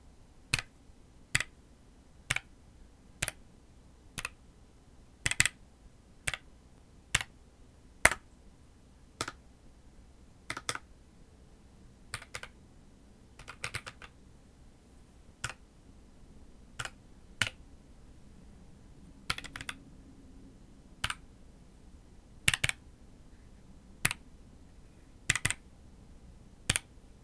Sound effects > Objects / House appliances
Isolated key presses on a PC keyboard
I recorded the sounds of my PC's keyboard because I needed them for the UI in my game. I think they sound quite nice! I just cut some of the silence between the clicks. Otherwise, the audio hasn't been edited at all.
keys, computer, keyboard, typing